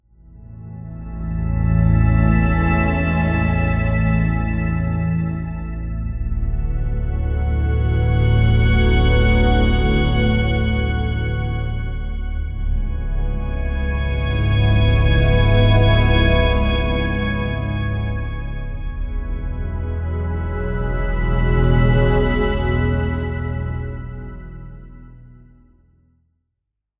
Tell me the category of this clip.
Music > Multiple instruments